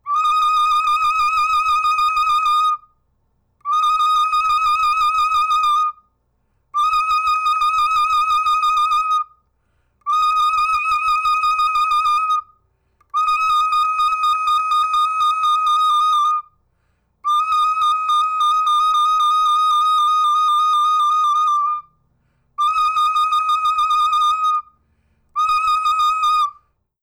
Music > Solo instrument
MUSCWind-Blue Snowball Microphone Recorder, Trills Nicholas Judy TDC
cartoon, recorder, Blue-Snowball, trill, Blue-brand